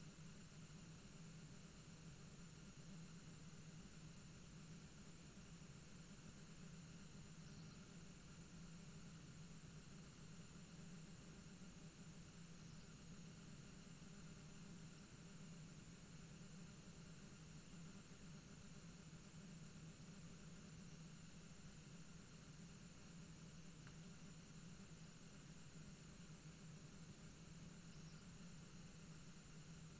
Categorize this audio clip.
Soundscapes > Nature